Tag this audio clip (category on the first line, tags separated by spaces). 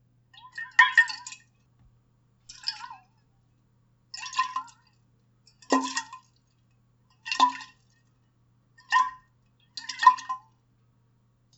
Sound effects > Objects / House appliances
container steel liquid